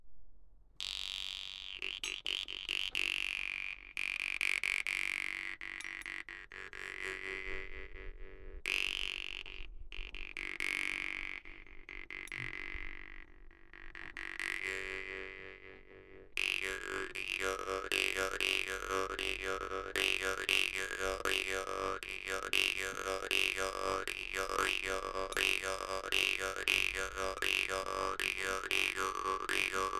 Music > Solo instrument
Vargan solo was recorded on Pixel 6pro